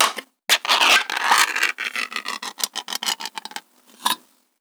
Sound effects > Objects / House appliances
Open a Fish Can recorded with my Shure SM 7B.